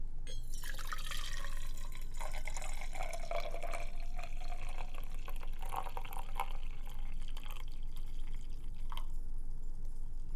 Sound effects > Objects / House appliances
Coffee Poured into Steel Tumbler
8 oz of coffee poured from a carafe into a stainless steel Kinto Travel Tumbler. Recorded with Zoom F3 and SO.1 omni mics.
coffee, liquid, metal, tumbler